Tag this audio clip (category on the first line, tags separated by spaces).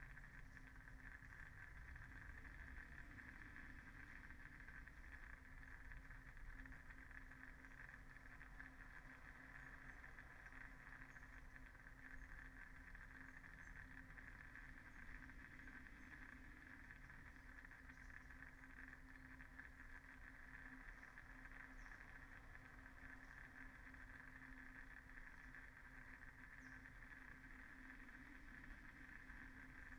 Soundscapes > Nature
nature raspberry-pi sound-installation phenological-recording